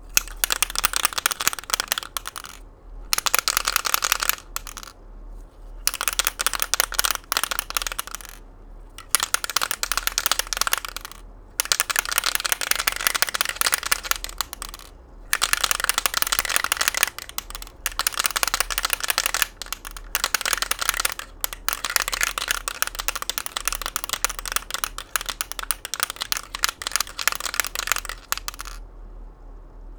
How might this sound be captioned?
Sound effects > Objects / House appliances
TOONShake-Blue Snowball Microphone, CU Spray Can Nicholas Judy TDC
A spray can shaking.
foley, Blue-brand, Blue-Snowball, shake, spray-can